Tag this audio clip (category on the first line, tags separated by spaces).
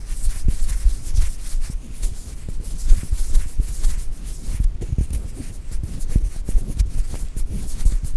Sound effects > Human sounds and actions
field-recording hand human shaking movement fingers sliding